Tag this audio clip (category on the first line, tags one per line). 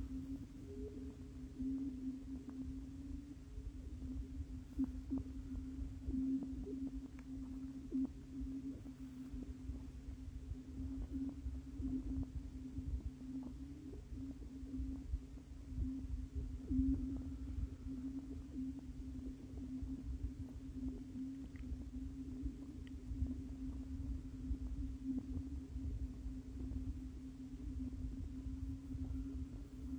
Soundscapes > Nature
field-recording
frozen
ice
ice-fissures
lake